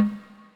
Music > Solo percussion
Snare Processed - Oneshot 192 - 14 by 6.5 inch Brass Ludwig
snares, beat, reverb, sfx, processed, snareroll, realdrum, brass, drum, percussion, oneshot, drums, snaredrum, hits, drumkit, crack, flam, fx, acoustic, ludwig, rimshot, rim, hit, realdrums, kit, snare, perc, roll, rimshots